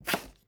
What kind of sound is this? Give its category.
Sound effects > Other